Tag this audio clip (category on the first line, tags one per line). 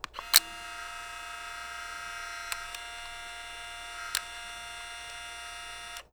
Sound effects > Objects / House appliances
fuji-instax-mini-9
take
shutter
camera
Blue-brand
servo
Blue-Snowball
picture
motor